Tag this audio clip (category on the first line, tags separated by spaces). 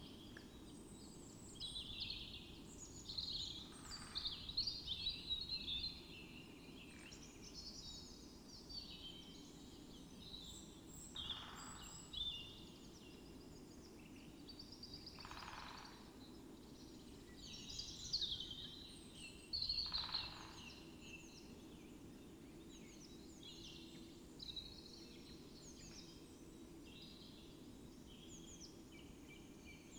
Soundscapes > Nature
alice-holt-forest weather-data soundscape modified-soundscape phenological-recording raspberry-pi sound-installation natural-soundscape nature data-to-sound field-recording Dendrophone artistic-intervention